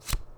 Sound effects > Objects / House appliances
GAMEMisc-Blue Snowball Microphone Card, Swipe from Other Hand 03 Nicholas Judy TDC
A card being swiped from another hand.
foley, swipe